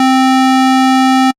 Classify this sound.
Instrument samples > Synths / Electronic